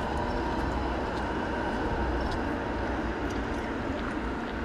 Sound effects > Vehicles
Uniform audio of bus moving, recorded with iphone 8
driving, vehicles